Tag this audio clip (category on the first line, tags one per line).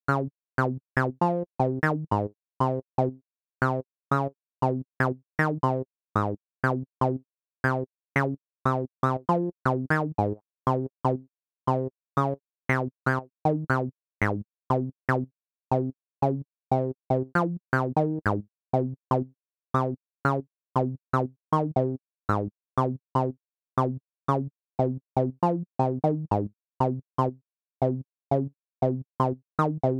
Music > Solo instrument
303
Acid
electronic
hardware
house
Recording
Roland
synth
TB-03
techno